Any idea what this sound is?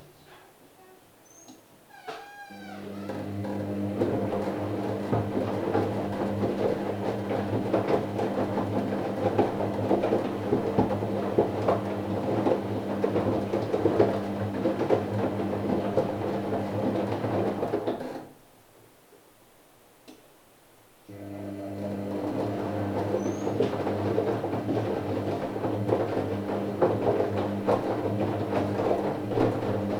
Sound effects > Objects / House appliances
Washing Mashine
Old recording, made probably with phone left next to a working washing machine, in early 2015.